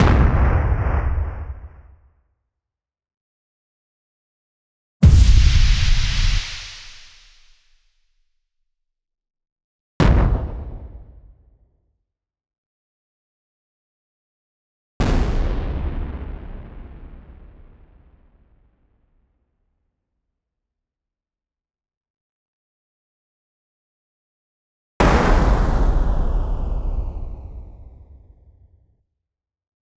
Sound effects > Electronic / Design
Massive Explosion FX
A collection of explosion sounds ranging from soft and subtle to massive and overdriven — featuring clean realistic blasts and sci-fi inspired impacts. All sounds were fully synthesized using the Massive synthesizer. This is a free preview from my Explosion FX Mini Pack — a small thank-you gift for the community. If you find these sounds useful, the full pack is available on a pay-what-you-want basis (starting from just $1). Your support helps me continue creating both free and paid sound libraries! 🔹 What’s included in the full pack?
massiv, synthesized, synth, sfx, blast, impact, explosion, effects, cinematic, game